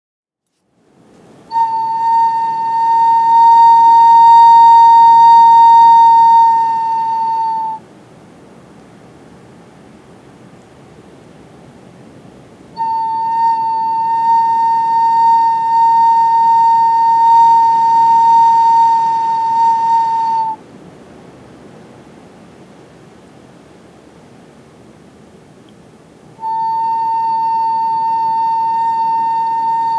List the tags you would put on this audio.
Instrument samples > Wind
Chile
field
flute
Sea
south

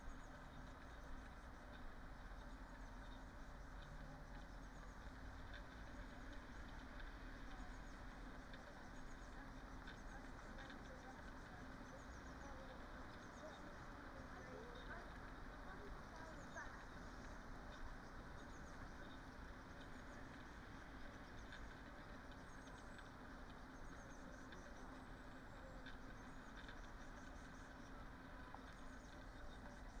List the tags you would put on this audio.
Soundscapes > Nature
raspberry-pi
soundscape
modified-soundscape
field-recording
phenological-recording
data-to-sound
sound-installation
weather-data
artistic-intervention
nature
natural-soundscape
alice-holt-forest
Dendrophone

